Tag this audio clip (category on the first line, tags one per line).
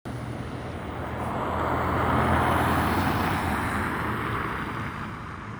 Sound effects > Vehicles
car
engine
vehicle